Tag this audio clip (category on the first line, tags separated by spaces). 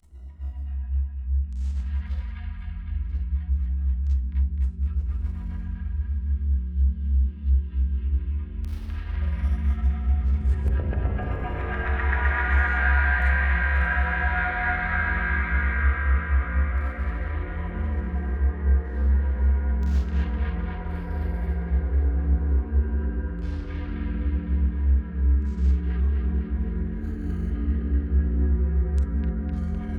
Soundscapes > Synthetic / Artificial
alien ambience ambient atmosphere bass bassy dark drone effect evolving experimental fx glitch glitchy howl landscape long low roar rumble sfx shifting shimmer shimmering slow synthetic texture wind